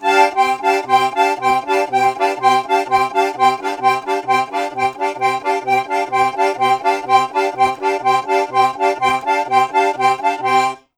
Music > Solo instrument
MUSCInst-Blue Snowball Microphone, CU Accordion, Fast Squeezes Nicholas Judy TDC
Fast accordion squeezes.